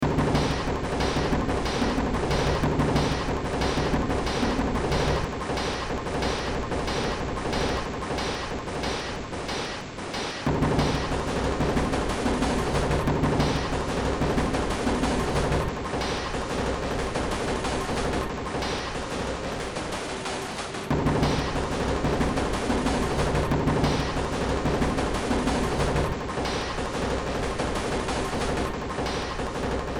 Music > Multiple instruments
Short Track #2990 (Industraumatic)
Ambient, Cyberpunk, Games, Horror, Industrial, Noise, Sci-fi, Soundtrack, Underground